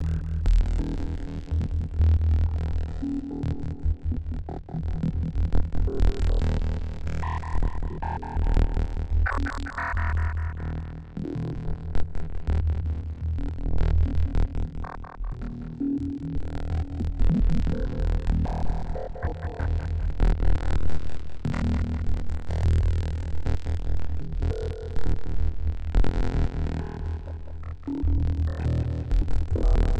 Soundscapes > Synthetic / Artificial
Cyber Bot City Ambient
Sample packs used from free sound: 3290__rutgermuller__music-techno-electro 37889__msx2plus__drum-loops Processed with Vocodex, OTT, ZL EQ, Fracture, Waveshaper.
Machine, Dark, Digital, UI, Atmosphere, City, Effect, Ambient, Game, Cinematic, Drone, Cyberpunk, FX, Cyber, Tension, Bot, robot, Film, Movie, Free, Neo